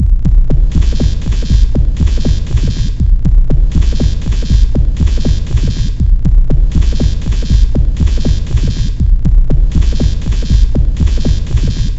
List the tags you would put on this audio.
Instrument samples > Percussion
Packs
Ambient
Drum
Alien
Industrial
Underground
Loopable
Weird
Samples
Soundtrack

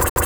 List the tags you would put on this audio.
Sound effects > Electronic / Design
FX
Glitch
One-shot

